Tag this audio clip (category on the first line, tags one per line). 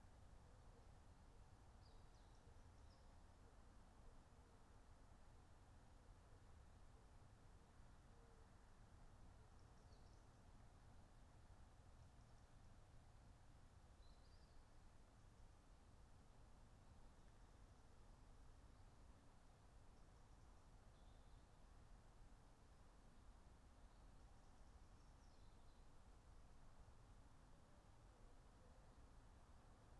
Soundscapes > Nature
raspberry-pi
phenological-recording
field-recording
alice-holt-forest
weather-data
artistic-intervention
natural-soundscape
Dendrophone
data-to-sound
modified-soundscape
nature
sound-installation
soundscape